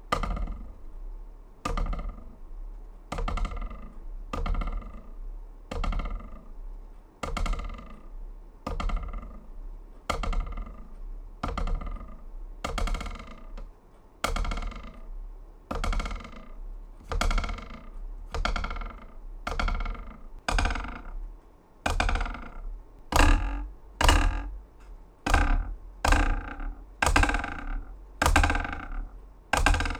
Sound effects > Objects / House appliances
TOONTwang-Blue Snowball Microphone, CU Wooden Ruler Nicholas Judy TDC
Wooden ruler twangs.
Blue-brand, Blue-Snowball, cartoon, ruler, twang, wooden